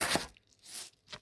Sound effects > Other
Long slice vegetable 8
Potato being slowly sliced with a Santoku knife in a small kitchen. Potato being slowly sliced with a Santoku knife in a small kitchen.
Chef, Chief, Cook, Cooking, Cut, Home, Indoor, Kitchen, Knife, Slice, Vegetable